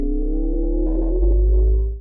Sound effects > Electronic / Design
Just a simple sound I recorded and processed in Audacity
battery, charge, charging, energy, power, space, starship